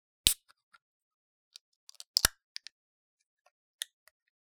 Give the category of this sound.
Sound effects > Objects / House appliances